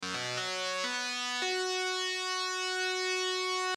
Sound effects > Vehicles
Synthesized pulse width modulation with added noise that sounds like the noise made by the Jeumont MR63 elements 40, 41, and 42 on the Montreal Metro when accelerating. These subway cars were equipped with a loud thyristor-impulse control system known as a current chopper, which produced this sound when accelerating. The later MR73 trains had a similar current chopper made by Jeumont, but it was much quieter and played 3 "notes" instead of 5.